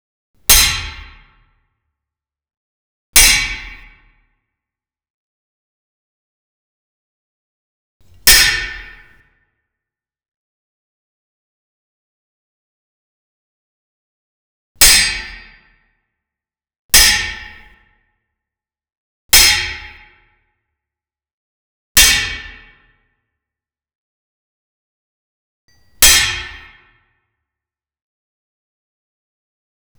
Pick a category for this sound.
Sound effects > Objects / House appliances